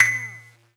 Sound effects > Electronic / Design
SFX MetalPing-04
Clip's empty! This metallic ping is inspired by the famous garand ping. Variation 4 of 4.
metal, ping, garandping, weapon, empty